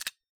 Sound effects > Other mechanisms, engines, machines
Circuit breaker shaker-001
Broken Circuit breaker, internal components generate sound when shaken. I recorded different variations of it.
shaker, percusive, sampling, recording